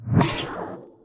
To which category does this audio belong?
Sound effects > Electronic / Design